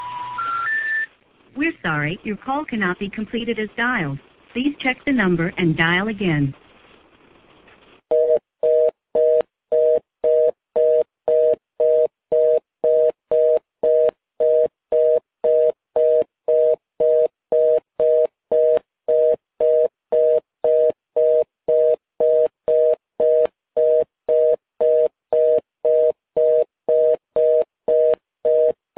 Sound effects > Other mechanisms, engines, machines
The call cannot be dialed.
This is record of not existing number.